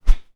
Sound effects > Objects / House appliances
Whoosh - Plastic Hanger 2 (middle clip) 4
Subject : Whoosh from a plastic clothe hanger. With clips adjustable across the width of it. I recorded whooshes with the clip on the outer edge and near the center hanger. Middle clip here refers to being closest to the middle of the hanger. Date YMD : 2025 04 21 Location : Gergueil France. Hardware : Tascam FR-AV2, Rode NT5 pointing up and towards me. Weather : Processing : Trimmed and Normalized in Audacity. Probably some fade in/out.
coat-hanger, NT5, Transition, Tascam, swing, Hanger, Plastic, swinging, Whoosh, SFX, Fast, Rode, FR-AV2